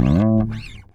Music > Solo instrument
slide up to note abrupt
bass, bassline, basslines, blues, chords, chuny, electric, funk, harmonic, harmonics, low, lowend, notes, pick, pluck, riff, slap